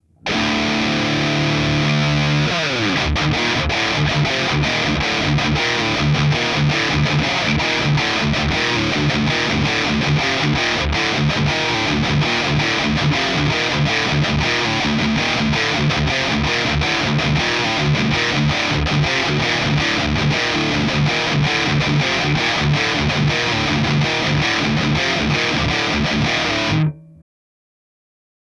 Music > Solo instrument
Heavy Metal style riffs made by me, using a custom made Les Paul style guitar with a Gibson 500T humbucker. 5150 TS profile used via a Kemper Profiler Amp, recorded on Reaper software.